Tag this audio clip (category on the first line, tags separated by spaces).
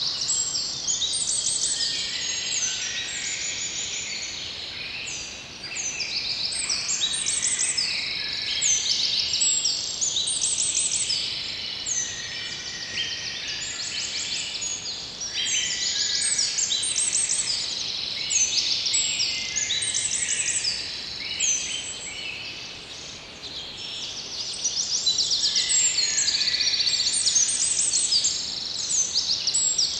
Soundscapes > Nature
birdsong field-recording forest background peaceful natural ambience soundscape nature rural calm birds European-forest outdoor Poland ambient atmosphere environmental wild